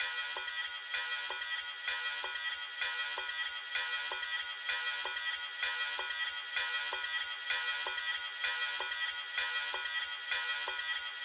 Music > Other
guitar high drone sound
drone, loop, techno